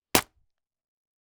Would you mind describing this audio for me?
Sound effects > Objects / House appliances
Stumping soda can crush 4
33cl, 33cl-tall, aluminium, aluminium-can, Can, compacting, crumple, crushing, empty, fast, fast-crush, flat, FR-AV2, metal, metallic, MKE600, Sennheiser, Soda, Soda-can, Sodacan, stepping, stomp, Stump, Stumping, tall, Tascam